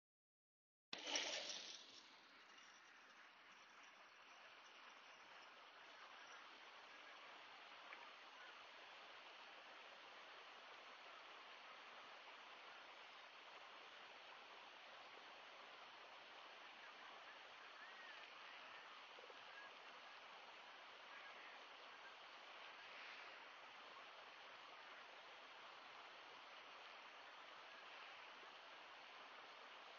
Soundscapes > Nature
Some crickets and water sound, also airplane Used my own phone to record. Enjoy!!
airplane, cricket, water